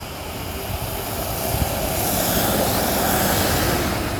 Soundscapes > Urban
Bus moving at 50 kmph
Bus moving at 50 kilometers per hour: Rusty sound of gravel on the road, revving engine, street background sound. Recorded with Samsung galaxy A33 voice recorder. The sound is not processed. Recorded on clear afternoon winter in the Tampere, Finland.
Bus, Drive, Public, Street